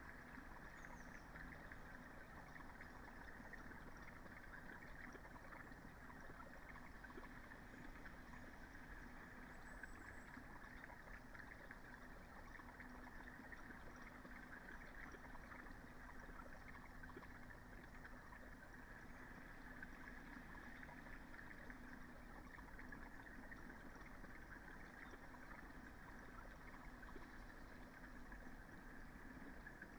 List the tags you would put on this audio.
Soundscapes > Nature
field-recording phenological-recording data-to-sound

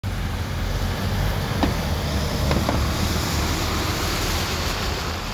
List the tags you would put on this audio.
Soundscapes > Urban
rain passing

